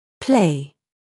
Speech > Solo speech
to play
english, pronunciation, word, voice